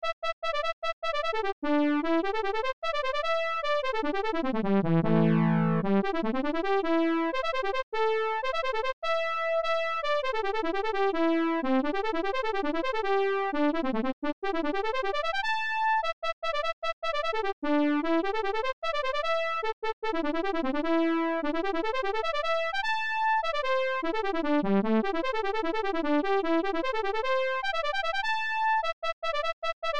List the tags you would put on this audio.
Music > Solo instrument
funny
horse

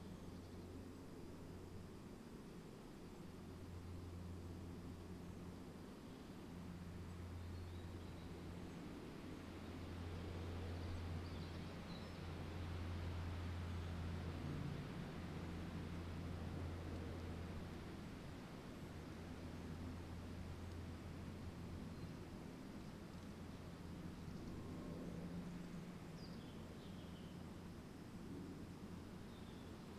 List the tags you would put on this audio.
Soundscapes > Nature
artistic-intervention
Dendrophone
modified-soundscape
natural-soundscape
soundscape
weather-data

